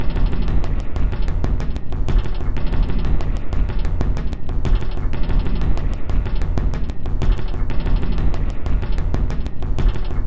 Instrument samples > Percussion
This 187bpm Drum Loop is good for composing Industrial/Electronic/Ambient songs or using as soundtrack to a sci-fi/suspense/horror indie game or short film.
Alien, Ambient, Industrial, Loop, Packs, Samples, Soundtrack, Underground, Weird